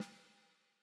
Music > Solo percussion
Snare Processed - Oneshot 12 - 14 by 6.5 inch Brass Ludwig
acoustic, crack, drum, drumkit, drums, fx, hit, hits, ludwig, perc, realdrum, rim, rimshot, rimshots, sfx, snare, snaredrum, snareroll, snares